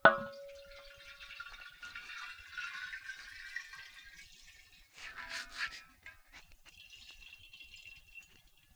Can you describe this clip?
Sound effects > Experimental
contact mic in metal thermos, emptying3
Water being poured out of a thermos recorded with a contact microphone. Somewhat quiet.
contact-mic, contact-microphone, experimental, thermos, water, water-bottle